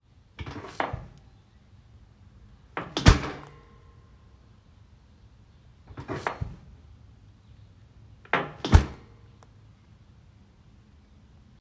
Sound effects > Objects / House appliances
Trash Can Open & Close
Tall round metal kitchen trashcan being open with the foot pedal, then closed.